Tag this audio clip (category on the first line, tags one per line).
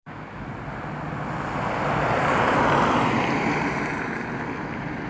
Soundscapes > Urban
CarInTampere
vehicle